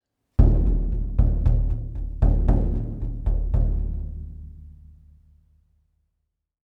Music > Solo percussion
Shamanic drum multiple strikes (64cm/26-inch)
Multiple strikes using a mallet on a shamanic 64cm/26-inch drum.
26-inch, 64cm, drum, percussion, percussive, skin, smamanic, sound